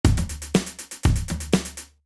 Music > Solo percussion
from my achievement sound
drum, drums, percussion